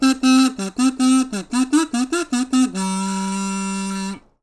Music > Solo instrument
MUSCInst-Blue Snowball Microphone, CU Kazoo, Mail Call Nicholas Judy TDC
A kazoo mail call.